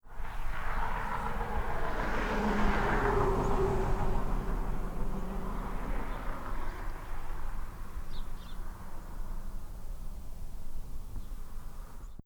Sound effects > Vehicles
Car Passing
car, driveby, road, automobile, passing, driving